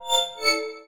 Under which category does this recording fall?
Sound effects > Electronic / Design